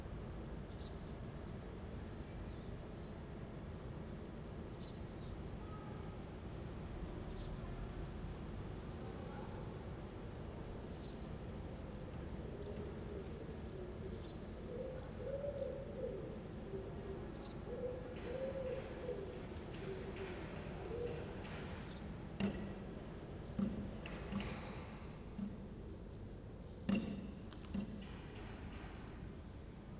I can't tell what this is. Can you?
Soundscapes > Urban
250629 07h58 Albi Church of St. Salvy - Bells - MKE600
Subject : Recording the Church of St. Salvy with a shotgun mic aiming for the bells. Date YMD : 2025 June 29 Morning 07h58 Location : Church of St. Salvy Albi 81000 Tarn Occitanie France. Sennheiser MKE600 with stock windcover P48, no filter. Weather : Sunny no wind/cloud. Processing : Trimmed in Audacity. Notes : There’s “Pause Guitare” being installed. So you may hear construction work in the background.